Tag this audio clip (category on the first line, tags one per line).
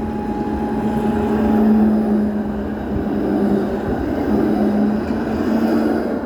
Sound effects > Vehicles
embedded-track
passing-by
Tampere